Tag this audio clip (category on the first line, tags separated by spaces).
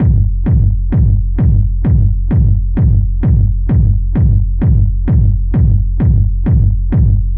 Music > Solo percussion
acoustic techno